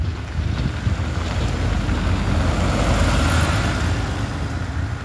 Sound effects > Vehicles
Bus sound in Tampere Hervanta Finland
bus, transportation, vehicle